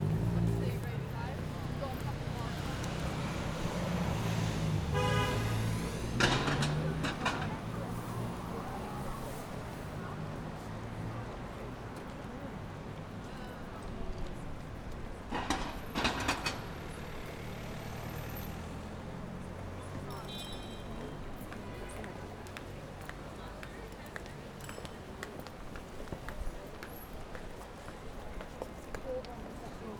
Soundscapes > Urban
LNDN SOUNDS 042
Recordings from near a street market stall in a mostly Indian / Bangladeshi neighborhood of London. Quite noisy and chaotic. Recorded with a Zoom H6
neighbourhood, city, bustling, market, london